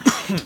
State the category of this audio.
Sound effects > Human sounds and actions